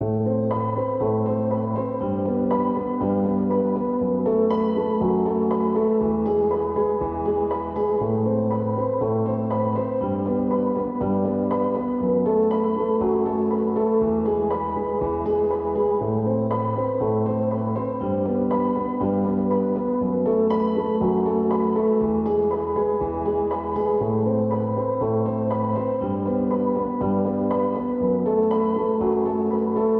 Music > Solo instrument
Piano loops 024 efect 4 octave long loop 120 bpm
free, simple, reverb, 120bpm, simplesamples, 120, music